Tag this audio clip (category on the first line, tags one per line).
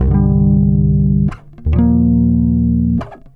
Instrument samples > String
bass
charvel
electric
fx
loops